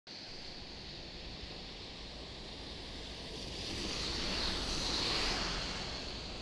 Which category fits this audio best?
Sound effects > Vehicles